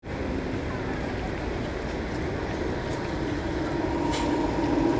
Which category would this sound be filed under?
Sound effects > Vehicles